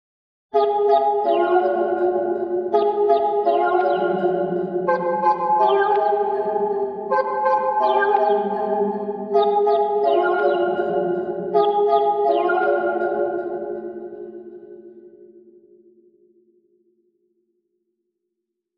Music > Solo instrument
laughing cats - 120 bpm
A series of alternating playful staccato synth chords with an echo effect that could represent a meow-meow-meow sound of cats laughing. Could be used for cat or animal characters in a game or animation. 120 bpm, 4/4, B flat major Made with my MIDI keyboard and GarageBand, then polished with BandLab to give it echo and ambience effects.
electronic, funny, loop, synth, music, 120bpm